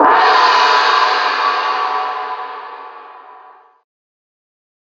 Instrument samples > Percussion
gong rock 2
IT'S NOT A GONG! It's a bassless sample to be used in music.
copper, disk, cymballs, bell, Zultan, fake, brass, Paiste, bronze, tam-tam, metallic, gong, steel, percussion, Sabian, Meinl, Bosporus, metal, chime, crash, disc, tamtam, cymbal, Istanbul, Zildjian